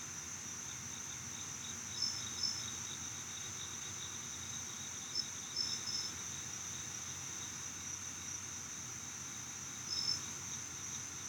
Soundscapes > Nature
Raleigh Night
Likely recorded with my laptop microphone. Something gentle, but relatively lo-fi. Sounds of nature from outside my window! Crickets and other critters making sounds out in the forest. Cut to loop.
ambiance, crickets, field-recording, forest, insects, nature, night, outdoors, soundscape, summer